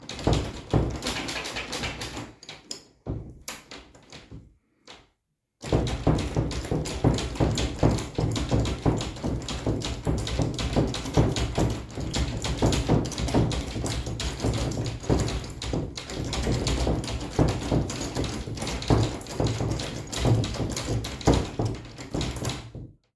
Sound effects > Objects / House appliances
DOORHdwr-Samsung Galaxy Smartphone Doorknob, Rattling Nicholas Judy TDC
doorknob, foley, knob, rattle